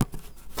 Sound effects > Other mechanisms, engines, machines
metal shop foley -130
percussion, knock, rustle, little, fx, bop